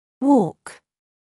Speech > Solo speech
to walk
pronunciation, english, voice, word